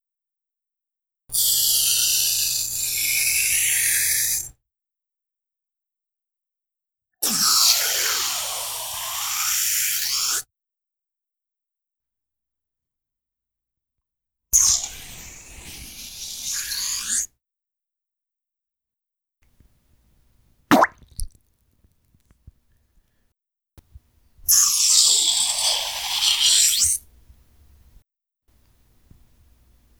Sound effects > Objects / House appliances
syringe sucking water sound
sound of syringe filled with water sucking up and squirting sound. has this distinct slurping sound heard when characters morph.
ectoplasm, medical, slurp, squirt, suck, water, wet